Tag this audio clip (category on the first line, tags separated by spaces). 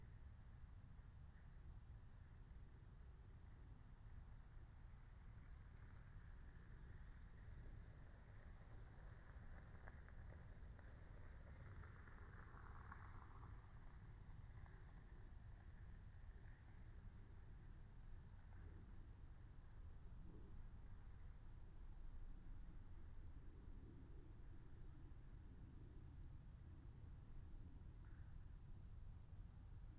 Soundscapes > Nature
meadow phenological-recording raspberry-pi soundscape